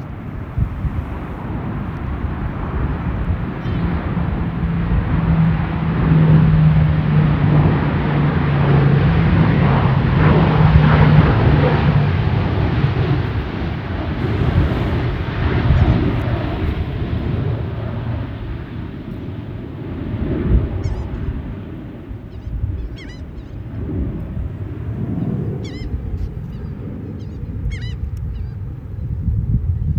Soundscapes > Nature
2 C-17s fly by at beach
Recorded mid-Cape Cod, Bay Side Spring '25 on iPhone 16
fly-by, military, cargo, propeller, planes, c-17, plane, airplane, aircraft, aviation